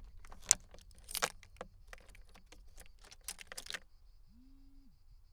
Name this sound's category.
Sound effects > Vehicles